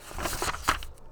Sound effects > Objects / House appliances
OBJBook-Blue Snowball Microphone Book, Page, Turn 01 Nicholas Judy TDC
Turning a page of a book.